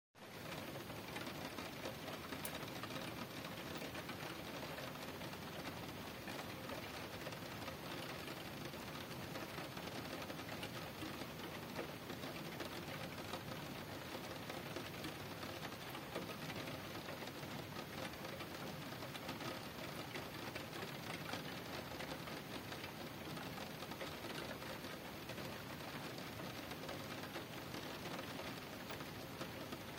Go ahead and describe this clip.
Soundscapes > Other
Rain under the Window
Sound of rain recorded from below a closed roof window
window, rain, storm, weather, raining, indoors, shower, cozy